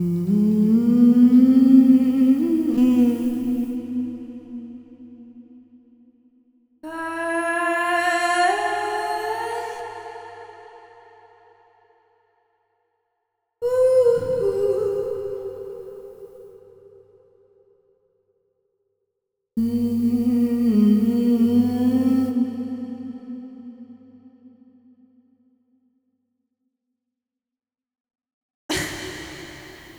Speech > Other
Beautiful Soul Woman Vocal Shots
Beautiful soulful singing vocal shots , kinda jazzy, kinda funky, by Kait Rayn, recorded at Studio CVLT in Arcata, CA in the redwoods of Humboldt County using a Sure Beta57a Microphone and AudioFuse interface. Recorded and Processed in Reaper. VST effects include Raum. Enjoy~
ambience, cathedral, melody, tonal, chill, vocals, funky, hall, vibrato, jazz, ambient, girl, deep, reverb, female, soul, soulful, beautiful, voice